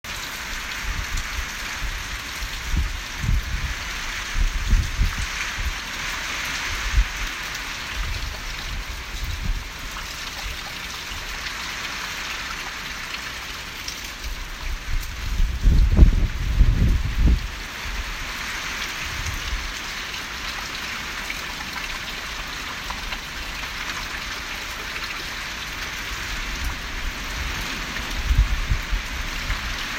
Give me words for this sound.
Soundscapes > Nature
Atmospheric river 10/24/2021
Heavy downpours called Atmospheric rivers , California
heavy-rain, nature, atmospheric-river, rain, field-recordings